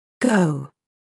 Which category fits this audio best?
Speech > Solo speech